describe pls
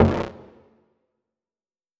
Sound effects > Electronic / Design
LoFi Grunt-04
Lofi, sudden and short grunt. Retro-esque sound emulation using wavetables.
animal, belch, grunt, lofi, monster, retro, synth